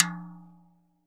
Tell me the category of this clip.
Music > Solo percussion